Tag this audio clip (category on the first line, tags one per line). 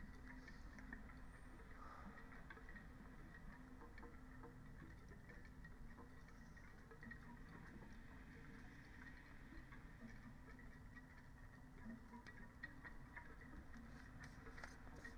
Soundscapes > Nature
data-to-sound
raspberry-pi
alice-holt-forest
sound-installation
phenological-recording
Dendrophone
natural-soundscape
weather-data
nature
field-recording
modified-soundscape